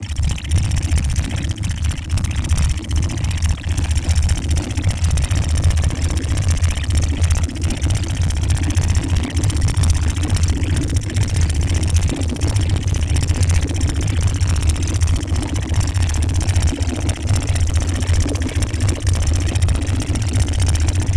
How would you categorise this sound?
Sound effects > Electronic / Design